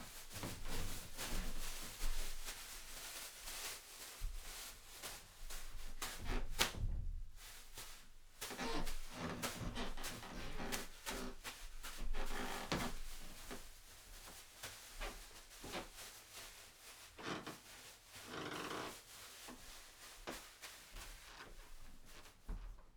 Sound effects > Objects / House appliances
A series of me recording multiple takes in a medium sized bedroom to fake a crowd. Clapping/talking and more atypical applause types and noises, at different positions in the room. Here interacting with different objects and stuff. Recorded with a Rode NT5 XY pair (next to the wall) and a Tascam FR-AV2. Kind of cringe by itself and unprocessed. But with multiple takes mixed it can fake a crowd. You will find most of the takes in the pack.